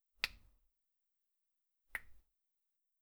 Sound effects > Human sounds and actions
Cracking knuckles
Recorded sound of cracking my knuckles.
bones, hand, knuckles, human, cracking